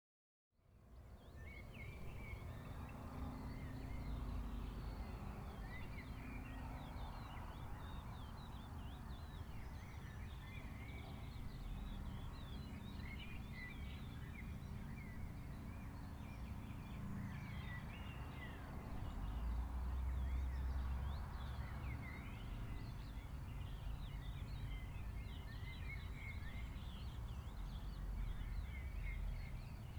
Soundscapes > Nature
20250611 6h Marata de matí
Recording of a dawn ambience in Marata, right where I used to live. That day I woke up early to capture morning sounds including a relatively quiet dawn chorus, but which includes multiple species. Shortly after the recording was made, I moved into a new place, so I wanted to get the recording done before I left. The recording was made on 11/6/2025, at around 6h.
Marata, spring, dawn-chorus, dawn, ambience, field-recording, birds, nature